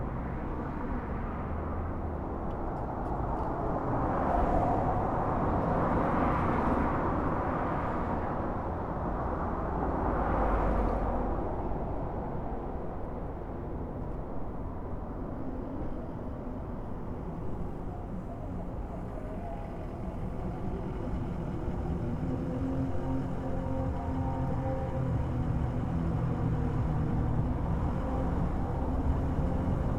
Soundscapes > Urban
City Traffic
Recorded in Grand Junction, CO on North Avenue. TASCAM DR-05X.
Cars, Motorcycle, Streets